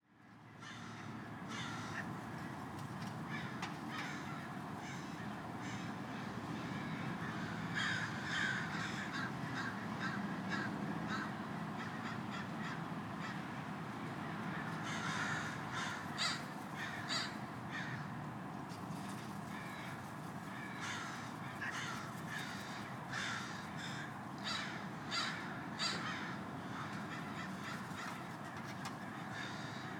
Soundscapes > Nature
Gentle Ambient Rooftop Crows
Crows gentle ambience. Wings flapping, fly-bys, distant crows, urban environment.
City, Cars, Peaceful, Ambience, Day, Road, Park, Urban, Field-recording, Birds, Crow, Nature